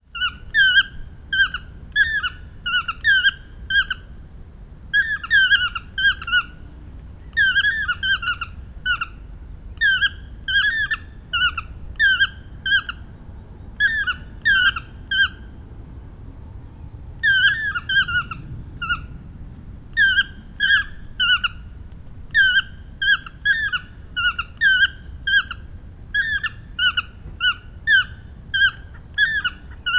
Soundscapes > Nature
Toucans calling
Two toucans are calling in La Fortuna, Costa Rica. Recorded with an Olympus LS-14.
bird, birds, birdsong, caribbean, field-recording, singing, toucan, toucans